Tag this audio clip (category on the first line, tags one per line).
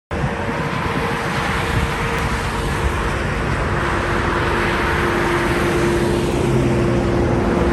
Sound effects > Vehicles
car
highway
road